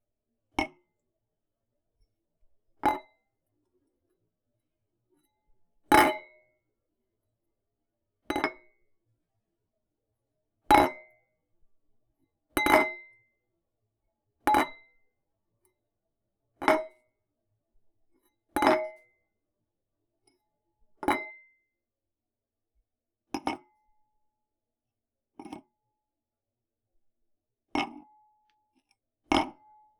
Sound effects > Objects / House appliances
thin glass
Picking up and dropping/placing a small glass on a countertop multiple times.
impact, place, hit, glass